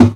Sound effects > Electronic / Design
Light Kick
little kick sound
Drum, Drumkit, Drums, EDM, Kick, Loop, Music